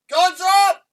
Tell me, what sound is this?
Speech > Solo speech
Soldier-Guns Up
Soldier getting his weapon ready
battle, combat, fighting, soldier, voice-acting, war